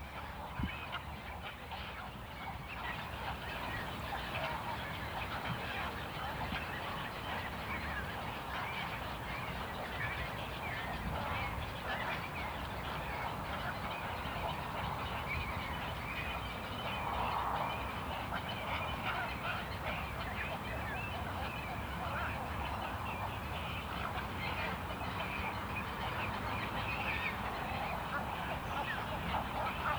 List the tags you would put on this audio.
Soundscapes > Nature
AMB
Lake
Nature

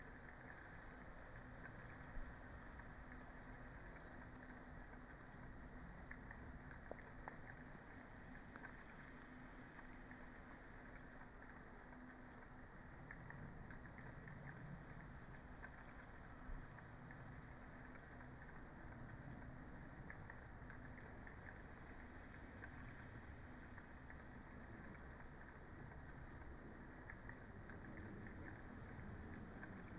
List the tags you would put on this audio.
Soundscapes > Nature
nature
phenological-recording
Dendrophone
alice-holt-forest
weather-data
soundscape
data-to-sound
modified-soundscape
sound-installation
field-recording
artistic-intervention
raspberry-pi
natural-soundscape

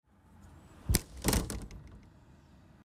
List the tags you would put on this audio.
Sound effects > Other
folley
SFX
door

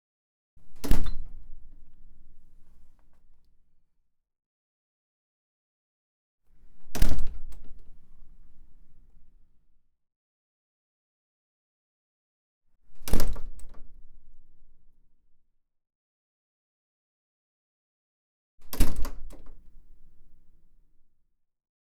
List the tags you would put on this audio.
Sound effects > Objects / House appliances
Slam
Door
Closing
Shut

